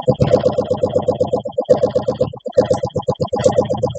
Sound effects > Electronic / Design
Stirring The Rhythms 4
weird-rhythm, glitchy-rhythm, PPG-Wave, wonky, content-creator, drowning, dark-techno, science-fiction, dark-soundscapes, rhythm, industrial-rhythm, scifi, sci-fi, sound-design, vst, noise-ambient, dark-design, noise